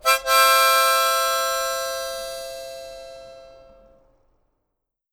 Music > Solo instrument
MUSCWind-Blue Snowball Microphone, CU Harmonica, Ta Da! Nicholas Judy TDC
A harmonica 'ta-da'.